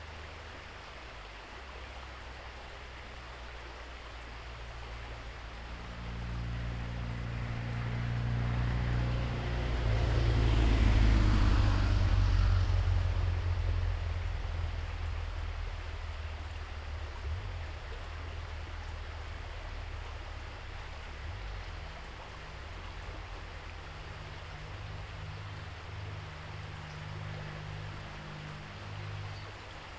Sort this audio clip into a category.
Soundscapes > Nature